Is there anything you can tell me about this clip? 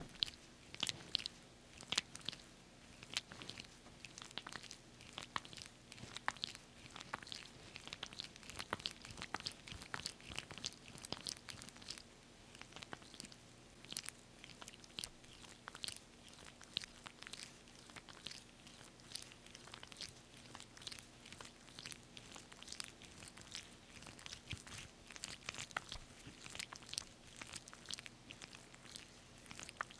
Sound effects > Human sounds and actions
Sexual Stroking Sounds, Wet
Recorded for use primarily in nsfw sound productions for VAs and nsfw audio creators! Always happy to hear about projects my sounds are used in, but not necessary at all!